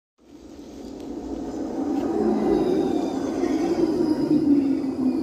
Soundscapes > Urban
final tram 21
finland, hervanta, tram